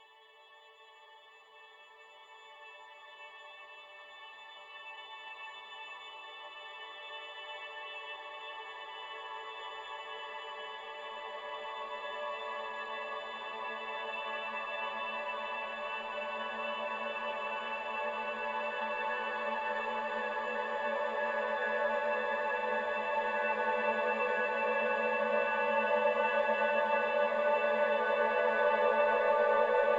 Soundscapes > Synthetic / Artificial
Complex shifting ambient drone 5
Light, airy drone with subtly shifting frequencies made with layered and processed synths. Use as is or sample/edit/rework as you wish See profile for more details.
light, atmosphere, warm, synth, drone, electronic, gentle, ambience, harmonious, delicate, synthesizers, subtle, synthesizer, pad, ambient, synths, airy, calm